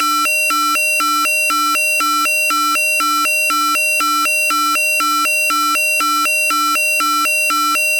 Sound effects > Electronic / Design
Looping Sci-Fi alarm SFX created using Phaseplant VST.